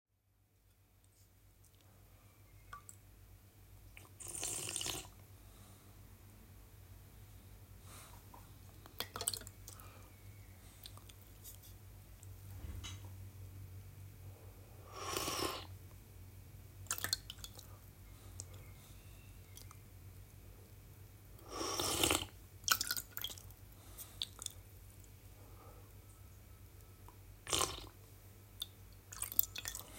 Sound effects > Human sounds and actions
slurp water
drinking water from spoon
slurp, slurping, soup, spoon, water